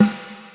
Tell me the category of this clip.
Music > Solo percussion